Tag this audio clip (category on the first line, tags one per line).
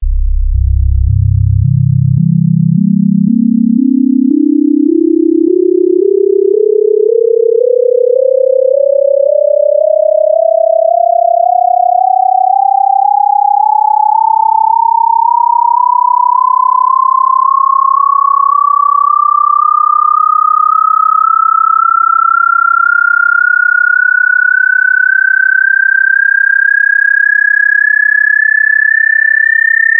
Instrument samples > Synths / Electronic
FM-X
Montage
Yamaha
MODX